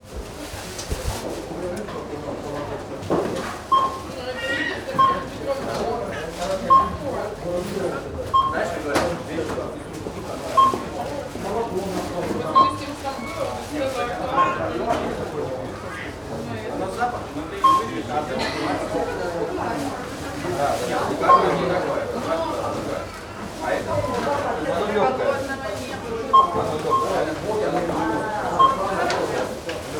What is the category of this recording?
Soundscapes > Urban